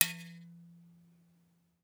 Sound effects > Other mechanisms, engines, machines
High Boing 03
sample, noise, boing